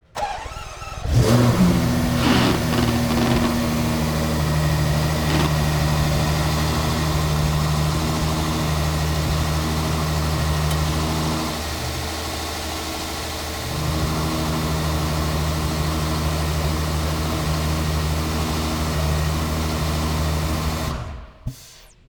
Sound effects > Vehicles
Ferrari Engine On Front
Front POV of a Ferrari engine turning on. Recorded with: Sound Devices Mix Pre 6 - II, Sennheiser MKH 50